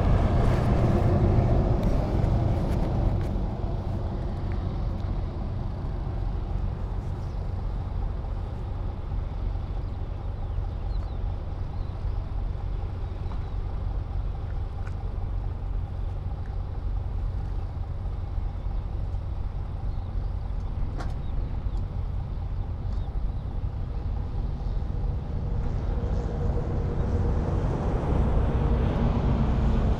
Soundscapes > Urban
AMB EXT Autovia Camiones camino a Linares 250529 01
Exterior Ambience in front of the highway. You can hear people aproaching to their car, closing the door and leave. Also trucks on the left or behind the recorder. Recorded using Sony PCM M10's internal mics with Rycote fur.
road, coches, camiones, autovia, ambience, highway